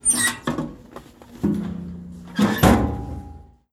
Sound effects > Objects / House appliances
DOORMetl-Samsung Galaxy Smartphone, CU USPO-Mail Slot, Open, Letters in Slot, Close Nicholas Judy TDC
A mail slot opening, letters into slot and closing. Recorded at the United States Post Office.
slot, Phone-recording, letters, foley, mail-slot, mail, close